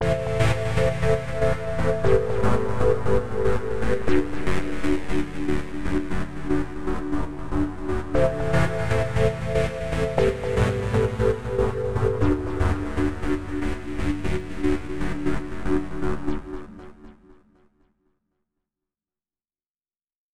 Instrument samples > Synths / Electronic
Chords synth
A short but nice progression of chords
sample, electronic, chords, synth, lead